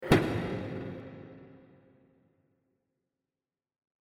Sound effects > Objects / House appliances
Closing Thump
Closing the bunk of a bed, can be anything tbh With a cool reverb sound
close, box, chest, trunk, bunk, door, thump